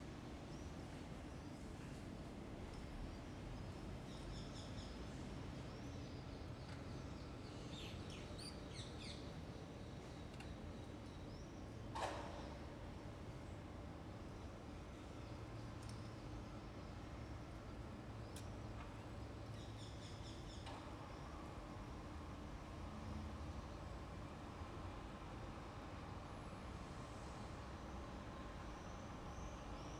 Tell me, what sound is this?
Soundscapes > Urban
Roma Rooftop EarlyMorning june2025 3
Early morning (6.30AM) on the rooftop of the Swiss Institute, Roma, june 2025. Many birds : seagulls, swifts and parrots. Movements of the crane in the nearby construction site. General rumble of the city, distant traffic. Sur le toit de l'Istituto Svizzero de Rome le matin (6h30), juin 2025. Une population d'oiseaux : mouettes, martinets, perruches. Les mouvements d'une grue, sur le site d'un chantier tout proche. Bruit de fond de la ville et trafic distant.
cityscape, parakeet, morning, field-recording, Italie, birds, swift, istituto-svizzero, italia, ambiance, construction, Roma, rooftop, seagull, crane, parrot, crows